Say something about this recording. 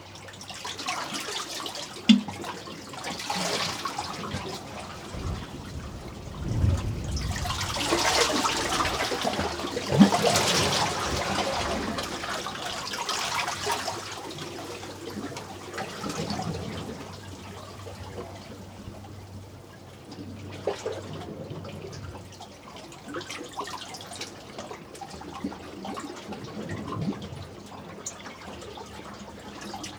Soundscapes > Nature
AMB WATER WAVES ROCK kengwai cct
Recorded among rocks in Corsica with a pair of Earsight Standard microphones (Immersive Soundscapes) and a Tascam FR-AV2 recorder. The recording was processed with slight equalization and subtle stereo enhancement. 07/24/2025 at 11:00 AM
waves, corsica, sea, rock, splash